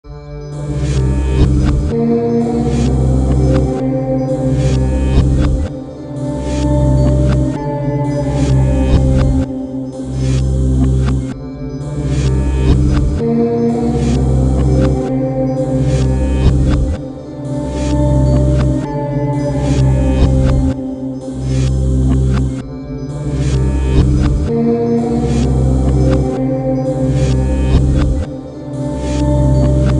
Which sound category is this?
Music > Multiple instruments